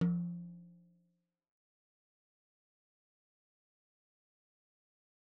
Music > Solo percussion
Hi Tom- Oneshots - 32- 10 inch by 8 inch Sonor Force 3007 Maple Rack
acoustic, beat, beatloop, beats, drum, drumkit, drums, fill, flam, hi-tom, hitom, instrument, kit, oneshot, perc, percs, percussion, rim, rimshot, roll, studio, tom, tomdrum, toms, velocity